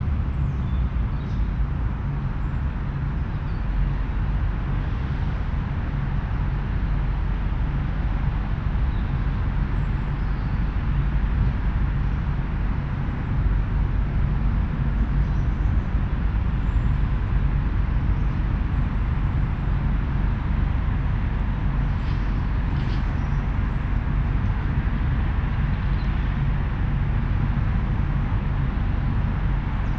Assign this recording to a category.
Soundscapes > Urban